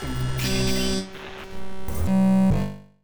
Sound effects > Electronic / Design
One-shot Glitch SFX with a mechanical Feel.
digital, electronic, glitch, mechanical, one-shot, stutter